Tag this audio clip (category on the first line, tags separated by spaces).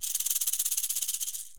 Instrument samples > Percussion
recording sampling